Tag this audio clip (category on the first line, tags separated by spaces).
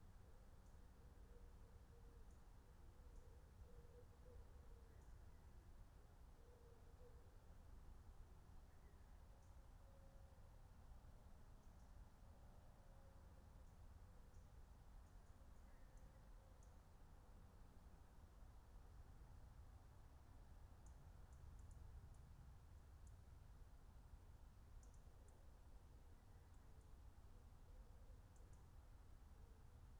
Soundscapes > Nature
meadow field-recording raspberry-pi phenological-recording alice-holt-forest natural-soundscape soundscape nature